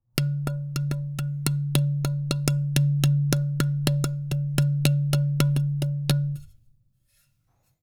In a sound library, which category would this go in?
Music > Solo instrument